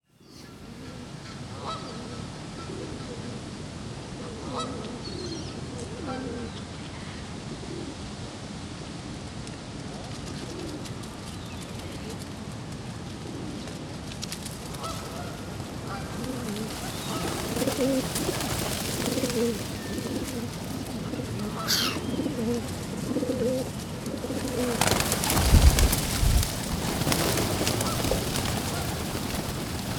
Soundscapes > Nature
BIRDMisc Pigeons-Goose-Gulls-Parakeets-Park Ambience GILLE DüSSELDORF Zoom H3VR 2025-11-27 Binaural
Ambisonics Field Recording converted to Binaural. Information about Microphone and Recording Location in the title.
ambisonics, spatial, binaural, birds, 3D